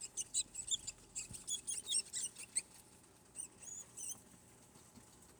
Sound effects > Animals
Rodents - Brown Rats; Several Babies Squeaking, Close Perspective
A group of baby domestic brown rats squeak while nourishing on their mom's milk.
pet-store, squeak, rat, pet, pet-shop, baby